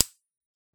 Sound effects > Other mechanisms, engines, machines
Upside-down circuit breaker switch-005
When it's upside-down the switch reproduce a slightly different sound, a bit dryer and with a shorter release time. There are also samples in the pack that attend the antithesis. Please follow my socials, don't be rude..
click, foley, percusive, recording, sampling